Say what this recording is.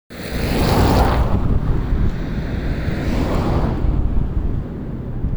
Sound effects > Vehicles
Outdoor recording of a passing car on Malminkaari Road in Helsinki. Captured with a OnePlus 8 Pro using the built‑in microphone.